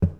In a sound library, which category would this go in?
Sound effects > Objects / House appliances